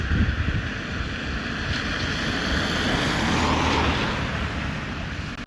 Soundscapes > Urban
car driving by
car, traffic, vehicle